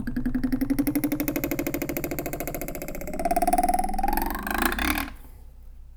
Sound effects > Objects / House appliances
Metal Beam Knife Plank Vibration Wobble SFX 4
Beam, Clang, ding, Foley, FX, Klang, Metal, metallic, Perc, SFX, ting, Trippy, Vibrate, Vibration, Wobble